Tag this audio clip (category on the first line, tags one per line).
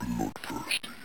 Speech > Solo speech
arcade
video
bloodthirsty
Retro
game
gaming
games